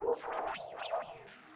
Soundscapes > Synthetic / Artificial
LFO Birdsong 25
birds, lfo, massive